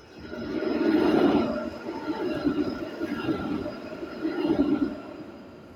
Sound effects > Vehicles
city tram
A tram driving by